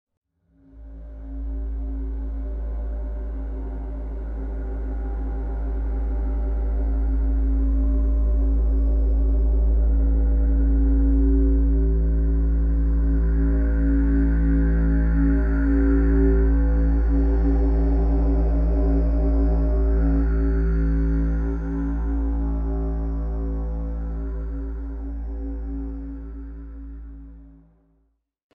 Soundscapes > Synthetic / Artificial
The Depths
Recorded from a didgeridoo. Slowed down the audio clip. Added reverb.
Didgeridoo, atmosphere, rumble, sci-fi, suspence, ambient, ambience, pad, soundscape, tribal, dark, drone, deep